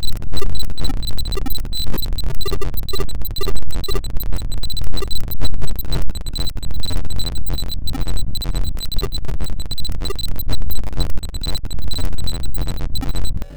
Sound effects > Experimental
made with samplebrain. aphex twin made this program. yes, that one. lookit up if ya want. the program is in comic sans lmao